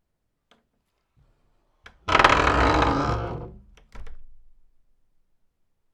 Sound effects > Objects / House appliances
Subject : An old barn door with big metal hinges and old wood. Closing. Date YMD : 2025 04 Location : Gergueil France Hardware : Tascam FR-AV2 and a Rode NT5 microphone in a XY setup. Weather : Processing : Trimmed and Normalized in Audacity. Maybe with a fade in and out? Should be in the metadata if there is.